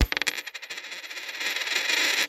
Sound effects > Objects / House appliances
OBJCoin-Samsung Galaxy Smartphone Dime, Drop, Spin 03 Nicholas Judy TDC
A dime dropping and spinning.